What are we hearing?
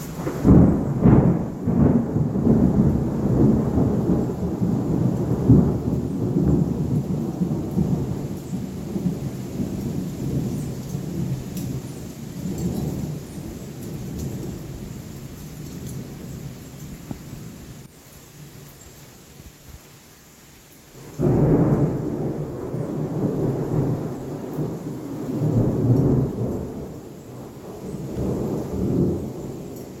Soundscapes > Nature
STORM-Samsung Galaxy Smartphone, CU Thunderstorm, Rain, Distant Birds and Fire Truck Nicholas Judy TDC
Thunderstorm and rain; birds chirping and fire truck sirens heard in the distance.
birds, boom, chirp, crackle, crash, distance, field-recording, fire-truck, nature, Phone-recording, rain, rumble, siren, strike, thunder, thunderstorm